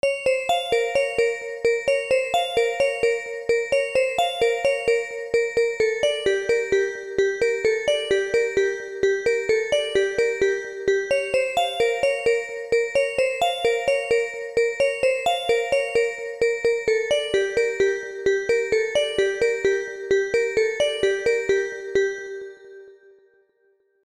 Music > Solo instrument
Bell 130 bpm
A simple melody I made with nexus 2. This melody is fantastic. Ableton live.
130, Bell, dreamy, expansive, liquid, morphing